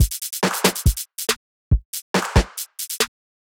Music > Multiple instruments
Fast Drum Loop
A 140 bpm drum loop. Good to use as a fill or something in Grime